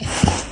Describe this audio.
Sound effects > Animals
Malinois Belgian Shepherd's good morning huff. iPhone 15 Pro video recording extracted via Audacity 3.7.5.